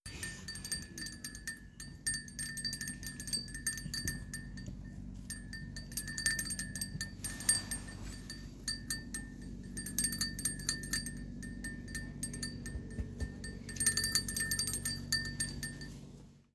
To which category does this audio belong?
Sound effects > Objects / House appliances